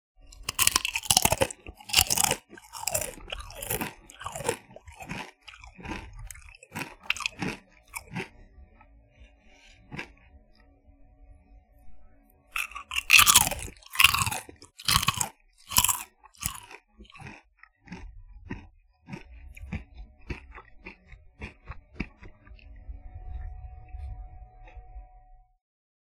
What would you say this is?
Sound effects > Human sounds and actions
I each some very crunchy potato chips very loudly. I did not clean it up, I leave that to you to do in your preferred method! Recorded with my H1essential Handy Recorder